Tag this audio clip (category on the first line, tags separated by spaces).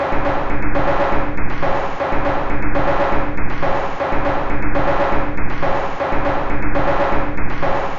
Instrument samples > Percussion
Samples Soundtrack Ambient Loopable Industrial Underground Packs Dark Drum Alien Loop Weird